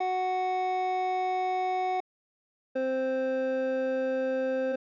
Sound effects > Electronic / Design
Start & Stop Racing Sound
Could be used as an racing game sound in games or TV. This sound was not created using A.I. Created using a Reason 12 synthesizer.
gaming, games